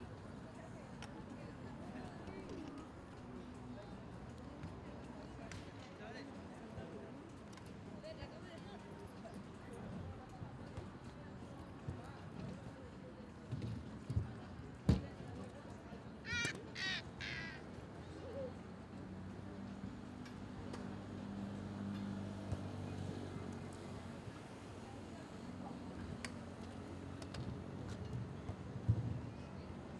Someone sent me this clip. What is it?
Soundscapes > Urban
Almazora Skate Park
Children playing and skating in Almazora, Castellón.
skate, field-recording, ambience